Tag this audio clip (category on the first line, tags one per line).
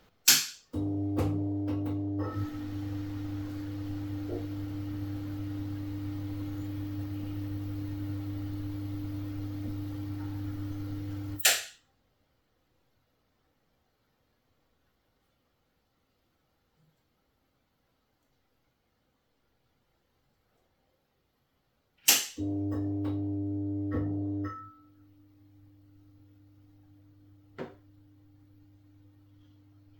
Sound effects > Objects / House appliances
80s
90s
electric
electronics
kitchen
lightbulb
office
old
retro
switch